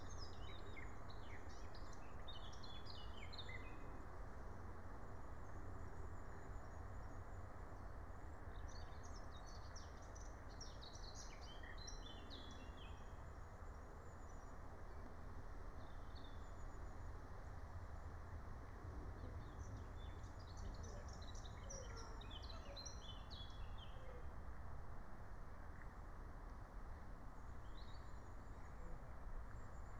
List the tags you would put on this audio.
Soundscapes > Nature
alice-holt-forest field-recording meadow natural-soundscape nature phenological-recording raspberry-pi soundscape

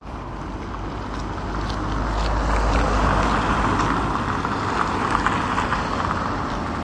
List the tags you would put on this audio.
Sound effects > Vehicles
car road tire

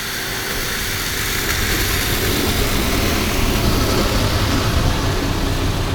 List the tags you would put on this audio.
Sound effects > Vehicles
bus transportation vehicle

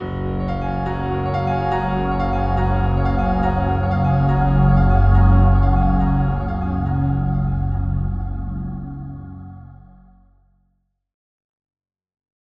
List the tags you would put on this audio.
Music > Multiple instruments
podcast-intro epic-riser gentle-riser podcast-outro intense-riser soothing-crescendo epic-crescendo outro intense-swell new-game-intro bright-crescendo crescendo begin-mission new-game-music powerful-riser riser new-game-theme mission-begin start-mission begin-new-game musical-swell start-new-game intro soothing-riser powerful-crescendo complete-quest mission-start beautiful-crescendo

